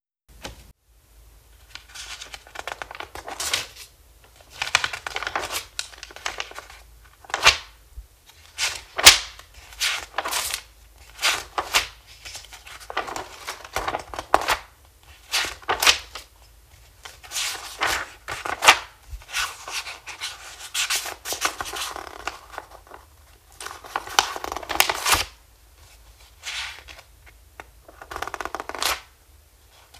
Sound effects > Objects / House appliances
Flipping pages of notebook
Flipping through pages of notebook
page, paper, pages, notebook, stationary, flip